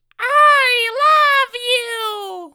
Speech > Solo speech
i love you puppet style
cute, puppet, male, cartoon, voice, funny, man